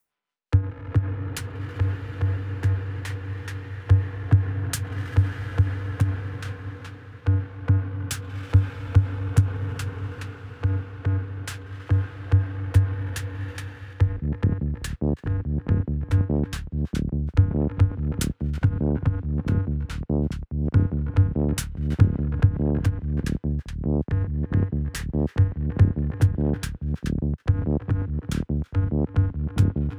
Music > Multiple instruments
clockwise works- BUMP 2
1-shot-improvisation with digital rhythm (Casio PT-31 synth+Zoom 9030 multieffect) and synthbass (Roland Juno-106) played live with no quantization..recorded and mixed with Ableton 11
loop, dance, juno-106, rhythm, casio, drum, electro-organic, improvised, lo-fi, beat, rubbish, groovy, minimal